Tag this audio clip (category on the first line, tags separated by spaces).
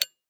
Sound effects > Human sounds and actions
activation off switch